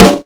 Instrument samples > Percussion
snare blend of 6×13 DW Edge and A&F Drum Co. 5.5x14 Steam Bent - trigger distorted 3
🥁 A DISTORTED MINISNARE (briefsnare; short in duration) GREAT FOR VIOLENT EXTREMOMUSIC!!! That's why it's in my snare folder and not the triggers. Distorted and short for extreme music. tags: trigger, triggersnare. trigger-snare
beat, blendsnare, briefsnare, click, corpsegrind, deathdoom, death-metal, distorted, doomdeath, drum, drums, DW, Godsnare, goregrind, Ludwig, mainsnare, metal, miniclick, mixed-snare, percussion, percussive, pop, rock, snare, snareblend, snared, The-Godsnare, trigger, trigger-snare, triggersnare